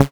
Instrument samples > Synths / Electronic
CINEMABASS 8 Db
additive-synthesis, bass, fm-synthesis